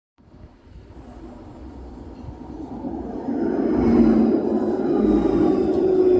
Soundscapes > Urban
final tram 10
tram, finland, hervanta